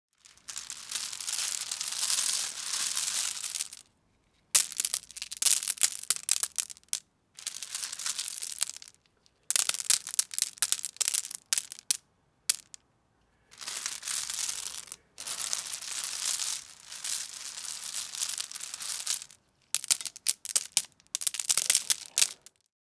Sound effects > Natural elements and explosions
ROCKMvmt Picking up smooth pebbles and dropping them
Picking up smooth pebbles and dropping them onto themselves.
pebbles, sfx, stones, drop, stone, falling, fall, dropping, pebble